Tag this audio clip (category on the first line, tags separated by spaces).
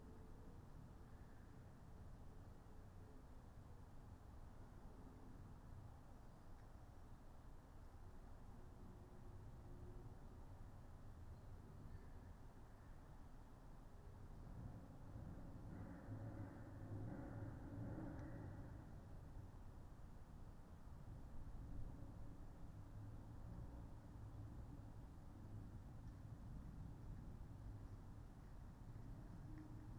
Soundscapes > Nature
alice-holt-forest artistic-intervention field-recording natural-soundscape nature phenological-recording sound-installation weather-data